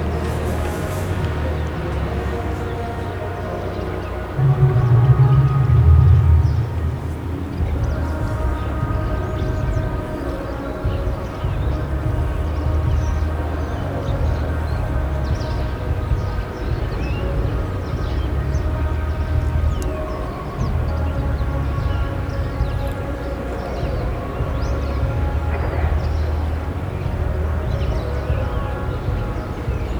Soundscapes > Urban
20251024 PalauSantJordi SoundTesting Birds Noisy
Urban Ambience Recording in collab with EMAV Audiovisual School, Barcelona, November 2026. Using a Zoom H-1 Recorder.